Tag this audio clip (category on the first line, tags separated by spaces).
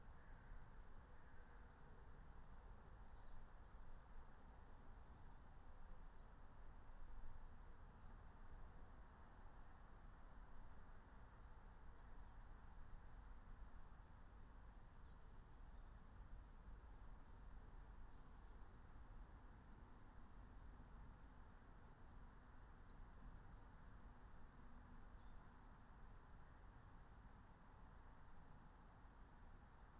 Soundscapes > Nature
alice-holt-forest field-recording meadow natural-soundscape phenological-recording soundscape